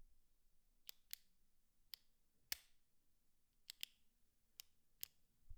Sound effects > Other mechanisms, engines, machines
Pen clicks
just a pen clicking to various intensities/durations
pen, click, button